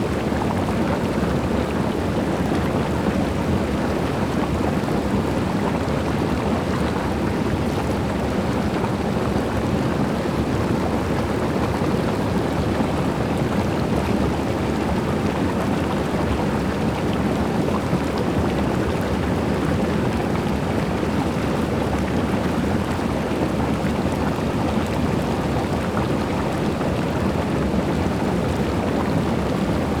Soundscapes > Nature
Ambiance Hot Spring Furnas Caldeiras Loop Stereo 04
Hot Spring - Close/Medium Recording - Loop Recorded at Furnas (Caldeiras), São Miguel. Gear: Sony PCM D100.